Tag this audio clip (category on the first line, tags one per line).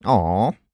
Speech > Solo speech
Hypercardioid
MKE600
Shotgun-mic
VA